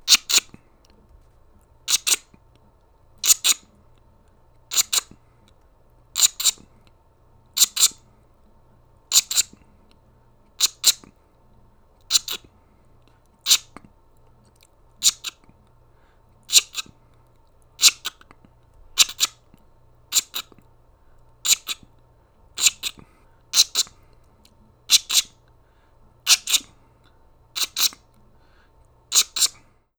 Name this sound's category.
Sound effects > Other mechanisms, engines, machines